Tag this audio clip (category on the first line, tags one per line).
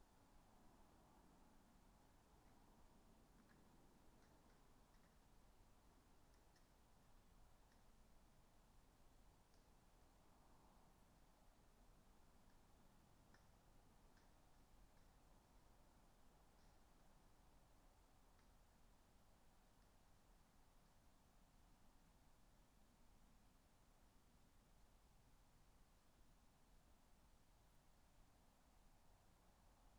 Soundscapes > Nature
alice-holt-forest
sound-installation
nature
raspberry-pi
modified-soundscape
artistic-intervention
weather-data
field-recording
phenological-recording
soundscape
Dendrophone
natural-soundscape